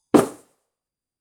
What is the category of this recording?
Sound effects > Objects / House appliances